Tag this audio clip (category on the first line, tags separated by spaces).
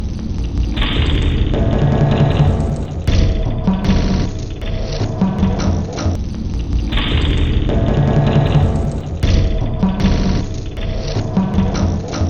Instrument samples > Percussion
Loopable Dark Drum Soundtrack Packs Underground Loop Samples Industrial Alien Weird Ambient